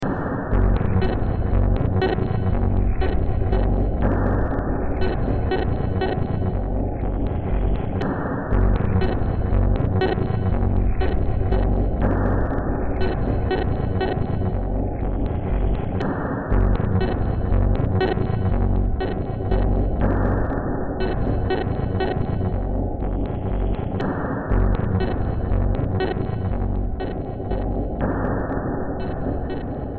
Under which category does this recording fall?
Music > Multiple instruments